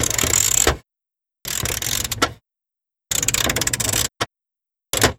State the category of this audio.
Sound effects > Other mechanisms, engines, machines